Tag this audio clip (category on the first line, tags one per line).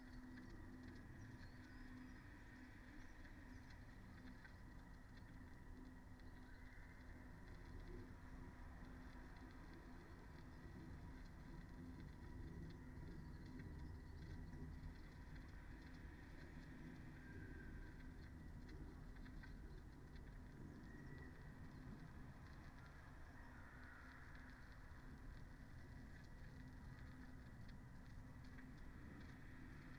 Soundscapes > Nature
nature
weather-data
phenological-recording
modified-soundscape
alice-holt-forest
natural-soundscape
data-to-sound
field-recording
sound-installation
raspberry-pi
Dendrophone
artistic-intervention
soundscape